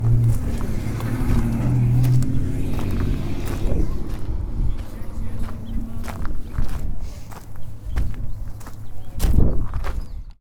Sound effects > Objects / House appliances
Junkyard Foley and FX Percs (Metal, Clanks, Scrapes, Bangs, Scrap, and Machines) 169
Bang, FX, rubbish, Metal, Percussion, SFX, Junkyard, garbage, Clang, Robotic, trash, Environment, dumpster, Robot, rattle, scrape, Bash, Metallic, Atmosphere, dumping, waste, Ambience, Clank, Perc, Foley, Junk, tube, Smash, Machine, Dump